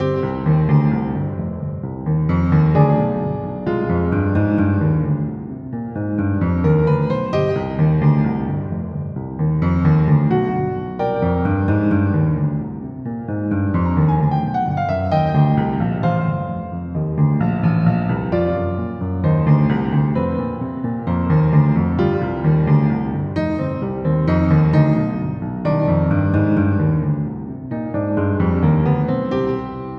Music > Solo instrument
Dark Piano Loop 131bpm
a darker looming piano riff loop